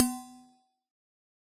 Sound effects > Objects / House appliances
Resonant coffee thermos-030
percusive, recording